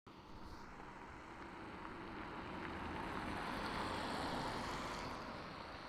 Sound effects > Vehicles
tampere car11
automobile, car, vehicle